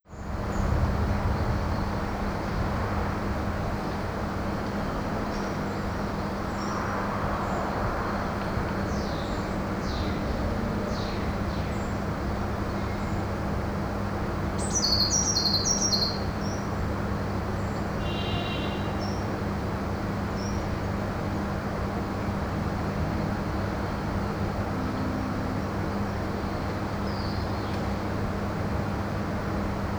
Soundscapes > Nature
040 BOTANICO AMBIENT BIRDS CITY 3
city, birds